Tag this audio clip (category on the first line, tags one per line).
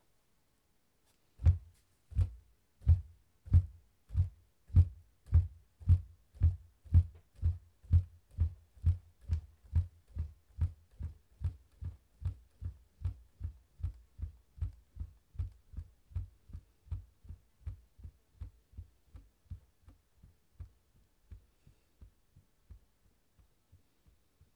Sound effects > Objects / House appliances
rocking
periodic
field-recording